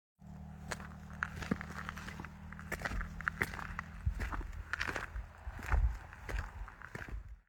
Sound effects > Human sounds and actions
Footsteps on Gravel
Footsteps on a gravelled road. Recorded on November 11th 2025 with a Google Pixel phone.
walking, cars, step, footsteps, road, walk, steps, gravel